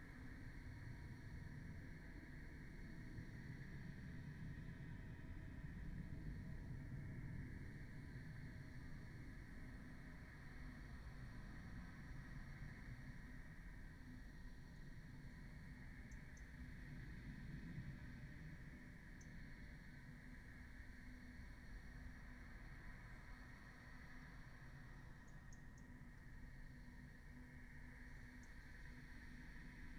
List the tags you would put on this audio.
Soundscapes > Nature
phenological-recording artistic-intervention

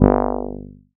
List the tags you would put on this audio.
Instrument samples > Synths / Electronic
fm-synthesis additive-synthesis bass

